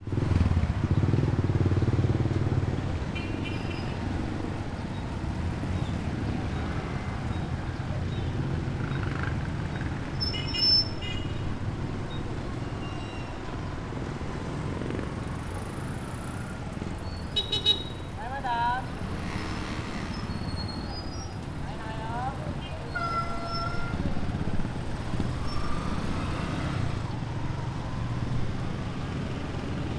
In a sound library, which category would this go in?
Soundscapes > Urban